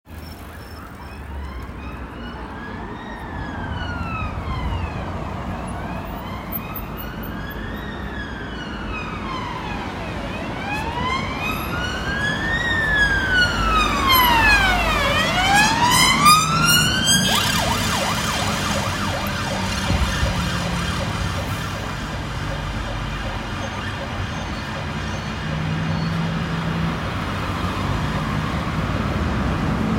Sound effects > Vehicles
Cardiff - Ambulance Drive By
Just a quick iPhone recording of a passing ambulance in Cardiff city centre.